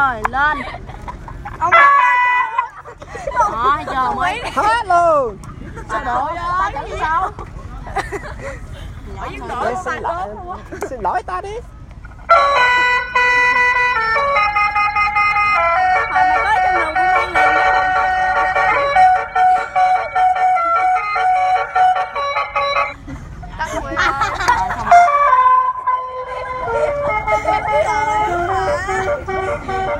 Sound effects > Electronic / Design
Nhạc Kèn Xe Đạp Điện - Electric Bicycle Horn
Sound from electric bicycle horn. Also have kids talk sound. Record use iPhone 7 Plus smart phone 2025.12.24 17:22
biceclita, bicycle, horn, music, musica